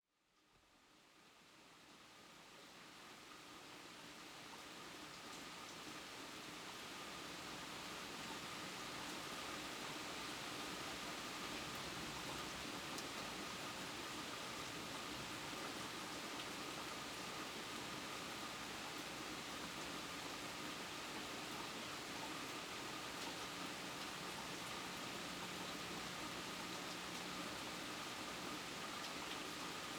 Sound effects > Natural elements and explosions
Recording of a late night rain shower from my condo's deck. The beginning has light rain hitting the deck and nearby trees. Some sounds of water dripping in a nearby gutter can also be heard. The rain does eventually intensify so the sounds are just rain hitting the deck and the trees. Recorded with a Zoom H6 Essential Edited in AVS Audio Editor software.